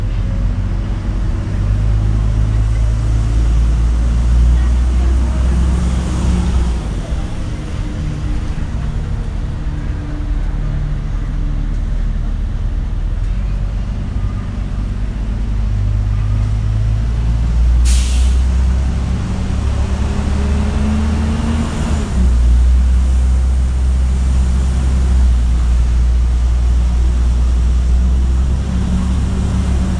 Soundscapes > Urban
The sound of being aboard a bus driving through a city
City, Trolley, Bus, Driving